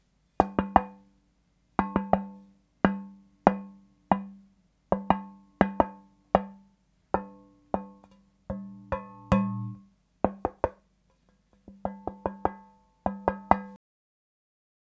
Sound effects > Objects / House appliances
tapping on glass
Tapping on a glass pane.